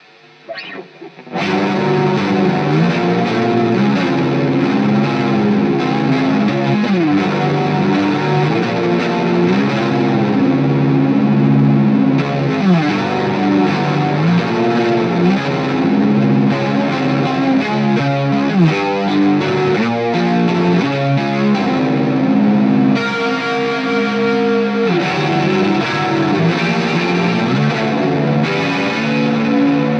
Music > Solo instrument
grunge alternative guitar 167bpm 1
I record this with a faim stratocaster and a few beautiful weirdos pedals My pedalboard Behringer graphic eq700 Cluster mask5 Nux Horse man Fugu3 Dédalo Toxic Fuzz Retrohead Maquina del tiempo Dédalo Shimverb Mooer Larm Efectos Reverb Alu9 Dédalo Boss Phase Shifter Mvave cube baby 🔥This sample is free🔥👽 If you enjoy my work, consider showing your support by grabbing me a coffee (or two)!